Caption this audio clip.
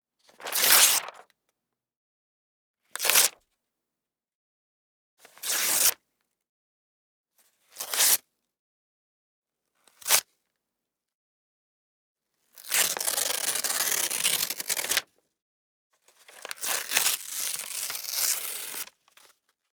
Sound effects > Objects / House appliances
Tearing up the paper 1
The sound of paper tearing. Recorded using Tascam Portacapture X8. Please write in the comments where you plan to use this sound. I think this sample deserves five stars in the rating ;-)